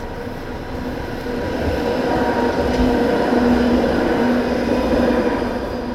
Sound effects > Vehicles
Tram 2025-10-27 klo 20.12.57
Sound recording of a tram passing by. Recording done in Hallilan-raitti, Hervanta, Finland near the tram line. Sound recorded with OnePlus 13 phone. Sound was recorded to be used as data for a binary sound classifier (classifying between a tram and a car).
public-transport, finland, tram